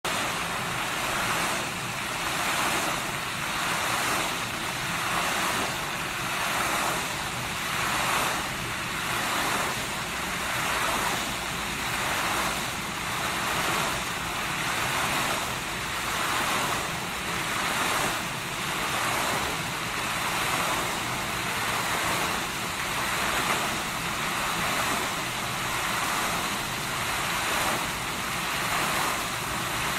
Soundscapes > Nature
6. LOL! Bird Flaps, Shakes & Jumps in Water Before Flying Off
This is a sound extracted from my original video that captures the raw beauty and authenticity of a bird enjoying its environment and putting on a show! In this 3-minute funny nature clip, a bird perches on a lamp stand inside a fountain, surrounded by flowing water, and gives us comedy gold. The video is titled: LOL! It's a classic example of an ASMR or ambient soundscape, characterized by its layering of sounds to create a feeling of being present in the location. Here is a breakdown of the specific sounds you can hear: a. Water: This is the dominant and most constant sound. It features the gentle, white-noise-like splash and babble of a fountain and the soft lapping of water against the concrete edges of the pond. b. Ducks/Birds: The most active animal presence. You can clearly hear their distinctive quacks. c. People: The sound of human activity is present but comfortably distant. d. Urban Rumble: There is a constant, low-frequency rumble of distant city traffic.
AnimalHumor, BirdBath, BirdComedy, BirdLOL, BirdLovers, BirdMoment, BirdOnLamp, BirdRoutine, BirdsInFountain, ComedyAnimals, CuteBird, fountain, fountainsplash, FunnyAnimalClips, FunnyBird, FunnyBirdVideo, hospital, outdoors, recationvideo, water, wildlife, WildlifeComedy